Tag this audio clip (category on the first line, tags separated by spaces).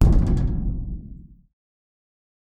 Sound effects > Other mechanisms, engines, machines
big boom drum industrial percussion